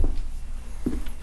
Sound effects > Objects / House appliances
knife and metal beam vibrations clicks dings and sfx-063
Beam, FX, Vibration, Perc, ting, Trippy, Klang, Clang, ding, Wobble, SFX, Foley, Vibrate, Metal, metallic